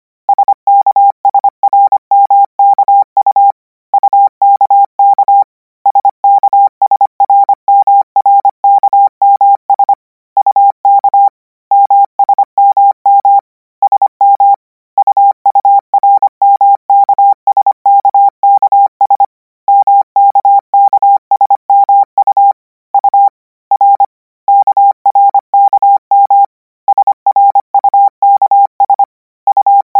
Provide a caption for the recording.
Sound effects > Electronic / Design
Koch 05 KMRSU - 300 N 25WPM 800Hz 90%
Practice hear characters 'KMRSU' use Koch method (after can hear charaters correct 90%, add 1 new character), 300 word random length, 25 word/minute, 800 Hz, 90% volume.
code, morse